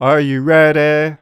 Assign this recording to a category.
Speech > Solo speech